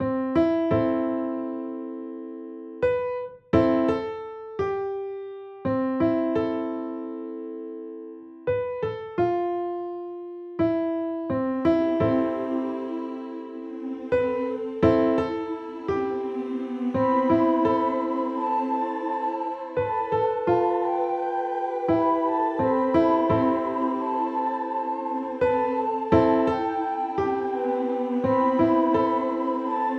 Music > Multiple instruments
An ambient pattern made with a piano flute and choir. Unknown BPM. Do what you want with this.